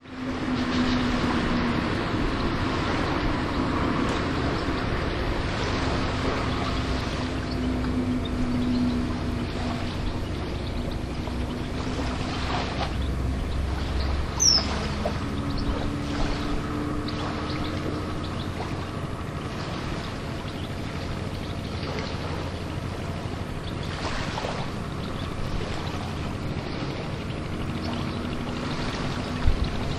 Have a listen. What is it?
Soundscapes > Nature
Catching the sunrise in Panormos Bay, Tinos, Greece. The morning bus can be heard leaving at the beginning. The seashore was about 15 meters from an Olympus LS-11 linear PCM recorder. Fishermen's boats can be heard returning to the bay from a faraway perspective. Some birds can be heard, along with gentle waves. This is a 16-bit stereo recording.